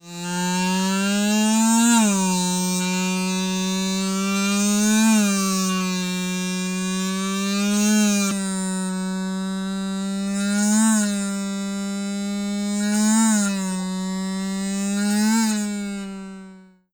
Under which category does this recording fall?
Sound effects > Vehicles